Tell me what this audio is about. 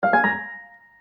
Instrument samples > Piano / Keyboard instruments
fg#a# Piano, small piece. Good for some notifications in mobile apps, etc.
piano, short